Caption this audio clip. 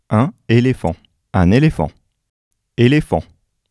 Speech > Solo speech
Elephant pronounced in French
Saying "Elephant" in french. Two firest take is saying "an elephant" 3rd one is just "elephant". Spoken into a Shure SM57 with a A2WS, recorded in a Tascam FR-AV2. Used Audacity to trim.
FR-AV2, Tascam